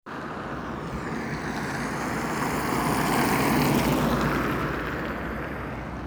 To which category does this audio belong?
Soundscapes > Urban